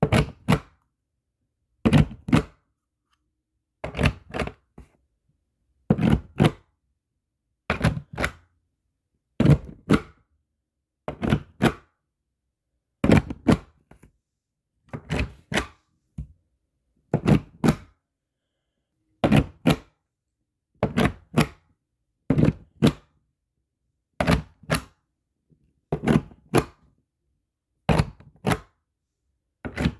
Sound effects > Objects / House appliances
An ink stamp stamping.